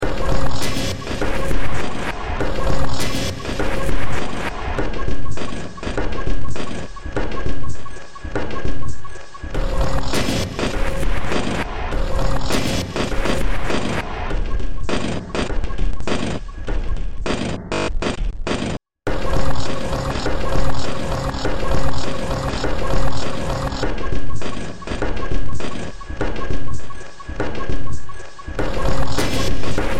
Music > Multiple instruments
Short Track #3577 (Industraumatic)
Track taken from the Industraumatic Project.